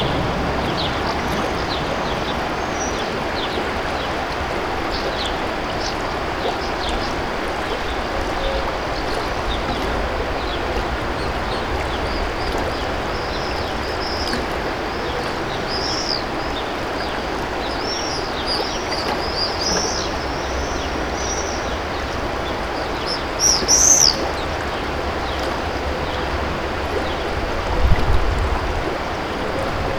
Sound effects > Natural elements and explosions
250710 20h09 Esperaza River Pacerelle Upstream MKE600
Subject : Recording the river from the most eastern pedestrian bridge in Esperaza, facing upstream. Sennheiser MKE600 with stock windcover P48, no filter. Weather : Processing : Trimmed in Audacity.
11260, 2025, Aude, Esperaza, FR-AV2, Hypercardioid, Juillet, July, MKE-600, MKE600, river, Sennheiser, Shotgun-mic, Shotgun-microphone, Single-mic-mono, Tascam, upstream, water